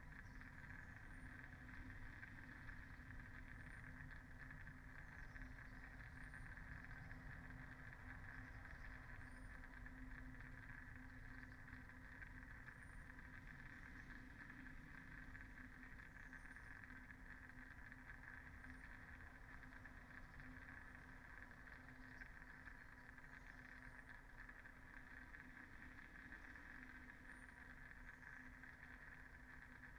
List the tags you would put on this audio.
Soundscapes > Nature
nature modified-soundscape phenological-recording field-recording soundscape raspberry-pi Dendrophone alice-holt-forest data-to-sound artistic-intervention weather-data sound-installation natural-soundscape

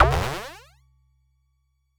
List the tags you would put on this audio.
Sound effects > Electronic / Design
alien grey gun laser sci-fi shoot shot space weapon

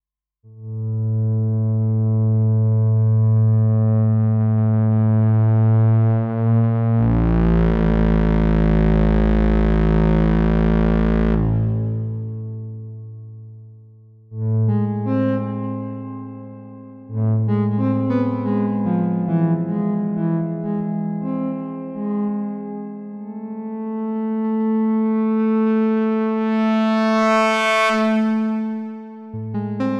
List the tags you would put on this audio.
Music > Solo instrument
background soundscape ambience atmosphere ambient Relaxing soma terra background-sound recording

